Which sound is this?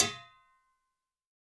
Sound effects > Objects / House appliances
A single hit on the side of a large metal pot with a drum stick. Recorded on a Shure SM57.